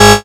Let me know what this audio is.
Instrument samples > Synths / Electronic
DRILLBASS 4 Ab
additive-synthesis, bass